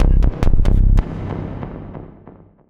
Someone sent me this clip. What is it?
Instrument samples > Synths / Electronic
CVLT BASS 183
bass, drops, low, sub, subwoofer, synthbass